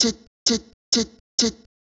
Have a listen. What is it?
Speech > Solo speech
BrazilFunk Vocal Chop One-shot 2 130bpm
BrazilFunk, One-shot, FX, Vocal